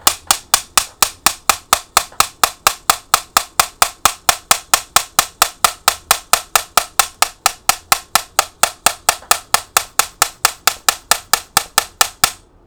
Sound effects > Objects / House appliances
OBJMisc-Blue Snowball Microphone, CU Clapperboard, Applause Nicholas Judy TDC
foley, Blue-Snowball, Blue-brand